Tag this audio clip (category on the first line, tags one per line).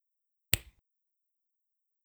Sound effects > Human sounds and actions
Finger
Snap
Snapping